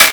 Instrument samples > Percussion
BrazilFunk Clap 1
Used a sample called ''FPC Wack'' from Flstudio original sample pack. Processed with ZL EQ, Waveshaper.